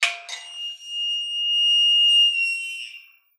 Sound effects > Other
A recording of a Metal gate being pushed open. Edited in RX 11.